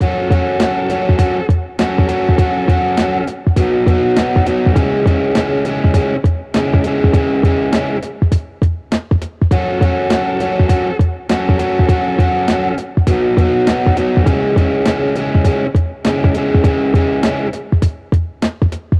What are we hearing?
Music > Multiple instruments
Otherwise, it is well usable up to 101 bpm.